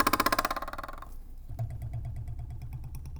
Sound effects > Objects / House appliances
knife and metal beam vibrations clicks dings and sfx-103
Beam, Clang, ding, Foley, FX, Klang, Metal, metallic, Perc, SFX, ting, Trippy, Vibrate, Vibration, Wobble